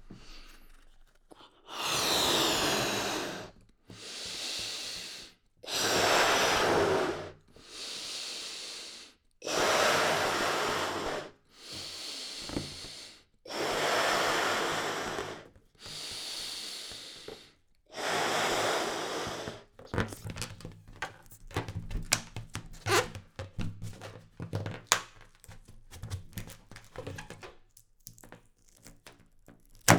Sound effects > Natural elements and explosions
Inflating balloon and tying knot 2
Subject : A dude Inflating a balloon. Then tying a knot (over two fingers, pinch it, pass the band over) Date YMD : 2025 July 25 Location : Indoors. Rode NT5 with a NT45-o Omni capsule. Weather : Processing : Trimmed and normalised in Audacity.
FR-AV2, inflate, Rode, NT45-o, Tascam, omni, omni-mic, knot, ballon, blowing, Inflating, indoor, mouth, Balloon, male, human, unprocessed, rubber, NT5-o, NT5o, tying, baloon